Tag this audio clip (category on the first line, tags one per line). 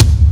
Instrument samples > Percussion
16x16
ashiko
bass
basstom
bata
bongo
bougarabou
deepbass
deeptom
djembe
drum
drums
dundun
DW
floor
floortom
Gretsch
hit
Ludwig
Mapex
PDP
Pearl
Premier
Sakae
Sonor
strike
Tama
tom
tom-tom
Yamaha